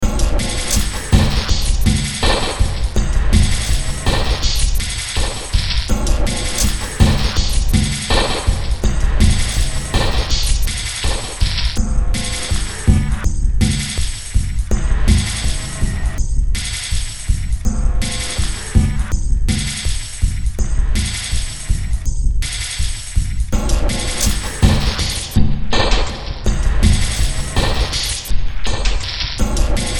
Music > Multiple instruments
Demo Track #3888 (Industraumatic)
Industrial, Noise, Sci-fi